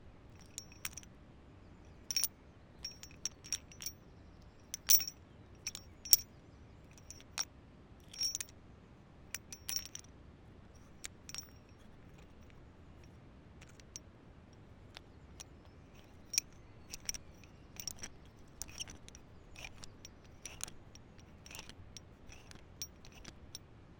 Sound effects > Other mechanisms, engines, machines
Chain and padlock 250607 05h40ish Albi
Subject : A thick chain and padlock attached to the stone near Albi's hydroelectric station. Date YMD : 2025 06 07 (Saturday). Early morning. Time = 05h40 ish Location : Albi 81000 Taarn Occitanie France. Hardware : Tascam FR-AV2, Rode NT5 with WS8 windshield. Had a pouch with the recorder, cables up my sleeve and mic in hand. Weather : Grey sky. Little to no wind, comfy temperature. Processing : Trimmed in Audacity. Other edits like filter, denoise etc… In the sound’s metadata. Notes : An early morning sound exploration trip. I heard a traffic light button a few days earlier and wanted to record it in a calmer environment.
2025, 81000, Albi, City, Early, Early-morning, France, FR-AV2, hand-held, handheld, Mono, morning, NT5, Occitanie, Outdoor, Rode, Saturday, Single-mic-mono, Tarn, Tascam, urbain, Wind-cover, WS8